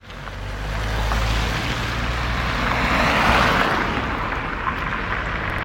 Soundscapes > Urban
Car passing Recording 40
Cars, Road